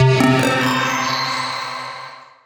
Instrument samples > Synths / Electronic
CVLT BASS 132
bassdrop, wobble, subs, bass, synthbass, low, lfo, subwoofer, clear, sub, subbass, synth, stabs, wavetable, lowend, drops